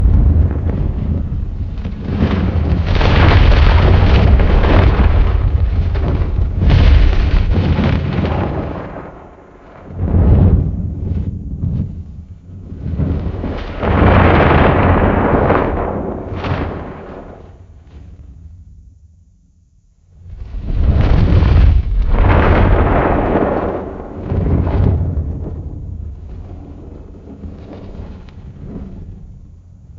Soundscapes > Nature
It didn't follow the prompt but I kept the sound.) • Audacity → View → Toolbars → Device Toolbar • select the Audio Host (Windows WASAPI) • choose the correct recording device (Loopback Option) For example, you might see: "Speakers (Realtek High Definition Audio) (loopback)" The sounds are carefully selected and remixed on WaveLab 11. The sound is semicorrupt because it misses the high frequencies at the beginning of each roar subsection. WaveLab 6 has a good harmonization tool for future edits.
dirt, earthshifting, shift, terrain, displacement, rocks, groundshift, tectonic, landslide, ground-shattering, avalanche, stone, upheaval, quake, descent, tumble, cascade, rupture, falling, stones, crumble, cascading, soil